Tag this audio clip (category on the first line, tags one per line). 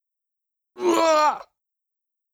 Speech > Other
RPG
Character